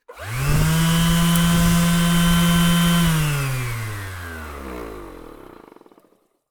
Sound effects > Other mechanisms, engines, machines
makita orbital sander foley-008
Foley, fx, Household, Mechanical, Metallic, Motor, sANDER, sANDING, Scrape, sfx, Shop, Tool, Tools, Woodshop, Workshop